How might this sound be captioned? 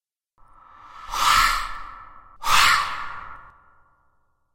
Sound effects > Other
Ghostly Swoosh
A spooky noise I made to accentuate the movement of a monster in a video many years ago.